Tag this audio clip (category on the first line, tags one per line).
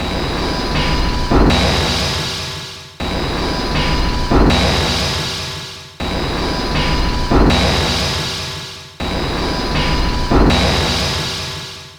Instrument samples > Percussion
Weird
Alien
Underground
Industrial
Soundtrack
Packs
Loopable
Dark
Loop
Ambient
Samples
Drum